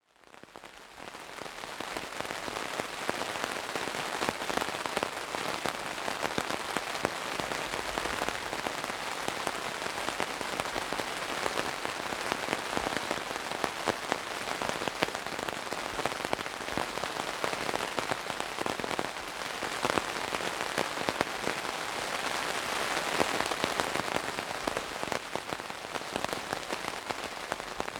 Soundscapes > Nature
Rain drips onto the tent roof during the night.